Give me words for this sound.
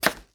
Sound effects > Other
Quick vegetable chop 8
Chef, Chief, Chop, Cook, Cooking, Home, Kitchen, Knife, Quick, Slice, Vegetable